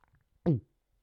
Sound effects > Human sounds and actions
Me when my boss calls my name. Wish me luck